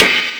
Instrument samples > Percussion
crash mini 1
shimmer, spock, crash, Istanbul, metallic, Zultan, polycrash, bang, clang, Avedis, Paiste, metal, China, Soultone, Zildjian, multicrash, Meinl, Stagg, crunch, smash, cymbal, sinocrash, Sabian, crack, sinocymbal, multi-China, clash, low-pitched